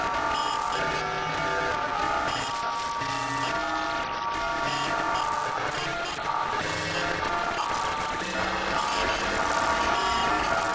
Sound effects > Electronic / Design
RGS-Random Glitch Sound 16
Synthed with phaseplant only. Sample used from 99Sounds.
Ambient, Glitch, Synthesizer, Texture, Weird